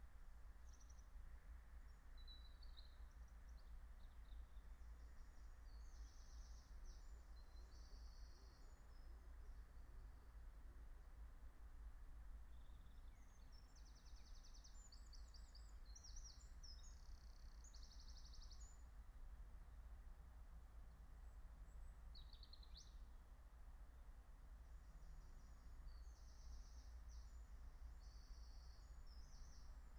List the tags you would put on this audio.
Soundscapes > Nature
alice-holt-forest
natural-soundscape
nature
soundscape
raspberry-pi
field-recording
phenological-recording
meadow

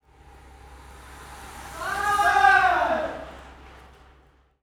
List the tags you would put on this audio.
Soundscapes > Urban
Cylclists
Field
passing
recording
residential
shouting

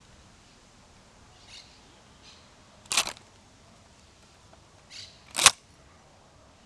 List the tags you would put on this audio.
Sound effects > Other mechanisms, engines, machines
gun
birds
field-recording

